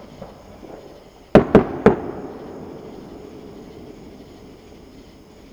Sound effects > Natural elements and explosions
Fireworks samples recorded during a heat wave in the southeast United States, July 4, 2025. Like a weed, the American Dream is constantly growing, under attack and evolving. Some people hate it and want to destroy it, some people tolerate living within it and some people glorify it. Most of the samples in this pack feature cicadas.
sfx, cicadas, experimental, independence, distant-fireworks, sample-packs, explosions, free-samples, america, fireworks-samples, distant-cicadas, samples, United-States, fireworks, patriotic, day, electronic